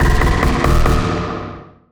Instrument samples > Synths / Electronic
CVLT BASS 78
subwoofer, lfo, sub, subs, wavetable, stabs, subbass, low, drops, lowend, bass, wobble, synth, synthbass, clear, bassdrop